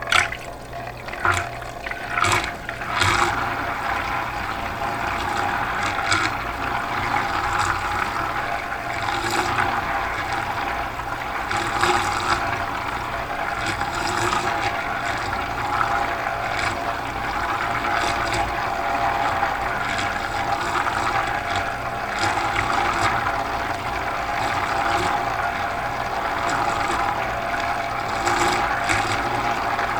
Sound effects > Natural elements and explosions
WATRMvmt-Blue Snowball Microphone, CU Swirling Nicholas Judy TDC
water, gurgle, Blue-Snowball, swirl, Blue-brand